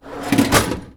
Sound effects > Other mechanisms, engines, machines
A crisp, resonant recording of a metal drawer being closed. Recorded with minimal background noise, the sound is ideal for use in Foley, sound design, ambiance creation, and mechanical transitions.